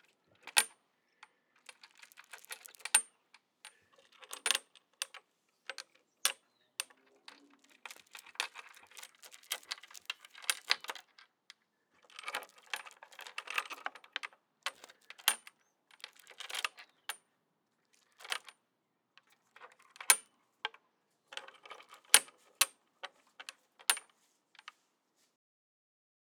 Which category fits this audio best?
Sound effects > Other mechanisms, engines, machines